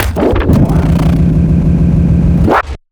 Sound effects > Other mechanisms, engines, machines
Sound Design Elements-Robot mechanism-013
whirring, robot, motors, servos, clicking, automation, digital, design, mechanical, grinding, elements, powerenergy, actuators, circuitry, operation, mechanism, processing, clanking, feedback, hydraulics, machine, sound, robotic, gears, synthetic, metallic, movement